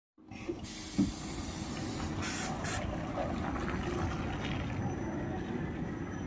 Sound effects > Vehicles
final bus 8
finland, bus